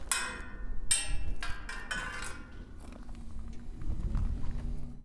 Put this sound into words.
Sound effects > Objects / House appliances
Junkyard Foley and FX Percs (Metal, Clanks, Scrapes, Bangs, Scrap, and Machines) 112
Junkyard, waste, SFX, rubbish, trash, Atmosphere, FX, Clank, Clang, Perc, Foley, Junk, Robotic, Bash, Bang, Dump, Smash, Ambience, Environment, rattle, Machine, Metallic, garbage, dumpster, Robot, Percussion, Metal, scrape, dumping, tube